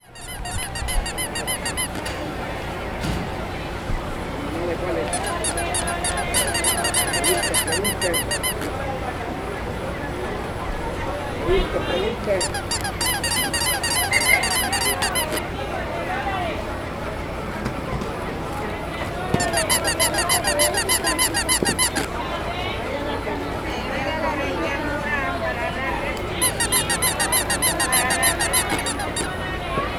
Sound effects > Other mechanisms, engines, machines
Conejito robot - San Salvador
Melodic sound. Different rabbit dolls emitting high sound. Recorded at Galeria Central shopping mall by Tania Molina, using a Zoom H5 in the historic centre of San Salvador, El Salvador.